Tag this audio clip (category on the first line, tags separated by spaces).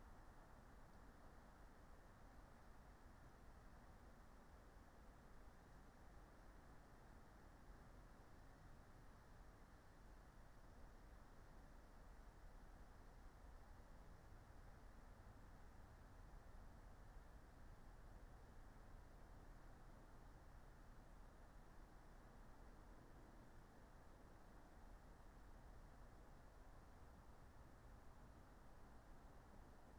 Soundscapes > Nature
artistic-intervention sound-installation modified-soundscape data-to-sound phenological-recording soundscape alice-holt-forest natural-soundscape nature field-recording Dendrophone weather-data raspberry-pi